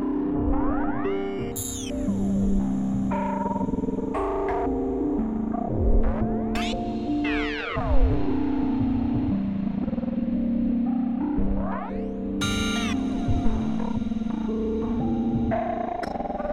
Instrument samples > Piano / Keyboard instruments
Rhodes piano halftime 58.050 2
This sound or collaborations of other sounds was made using FL Studio 2024 with various VST's effects applied. This sound may or may not have been altered via stretching, panning, Equalization, Parametric EQ, Reverb, Delay, Distortion, Filtering/Lows/Highs/Mid's, Layering, chopping and many other sound manipulation techniques.
keyboard, effects, piano, rhodes